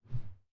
Sound effects > Electronic / Design
Broomstick whoosh 3 - Subtle - OKM1
Subject : Recording a whoosh from a Broomstick (without the broom, just the plastic stick) Date YMD : 2025 July 12 Location : Indoor, Espéraza, France. Soundman OKM1 Weather : Processing : Trimmed in Audacity fade in/out. Maybe some denoise.
Woosh, plastic, broom, Tascam, Subtle, stick, transition, OKM1, whooshes, swipe, FR-AV2, Soundman, quiet, broom-stick, SFX, whoosh